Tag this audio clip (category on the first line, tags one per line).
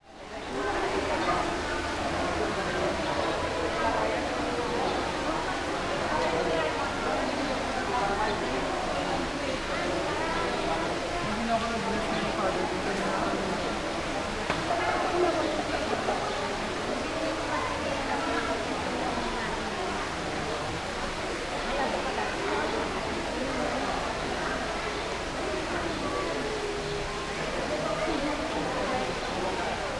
Speech > Conversation / Crowd
adults
ambience
atmosphere
birds
children
crowd
crowded
field-recording
fountain
holy
hubbub
kids
lively
men
miracle
miraculous
people
Philippines
pilgrims
reverberant
Saint-Padre-Pio
sanctuary
Santo-tomas
soundscape
voices
walla
water
white-noise
women